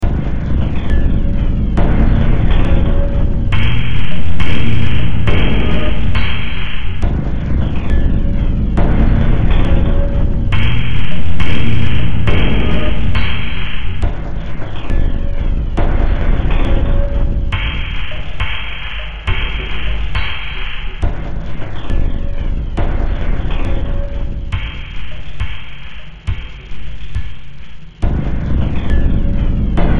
Music > Multiple instruments
Demo Track #3476 (Industraumatic)
Track taken from the Industraumatic Project.
Soundtrack, Ambient, Horror, Underground, Cyberpunk, Games, Industrial, Noise, Sci-fi